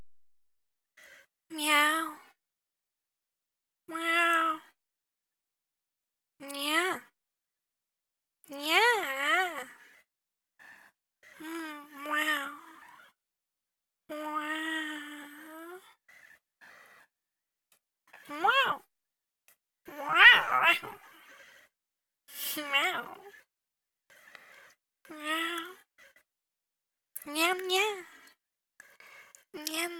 Speech > Solo speech
someone wanted more kitty sounds, so here they are :>